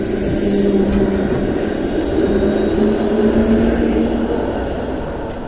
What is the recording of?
Soundscapes > Urban
Passing Tram 16
city, field-recording, outside, street, traffic, tram, trolley, urban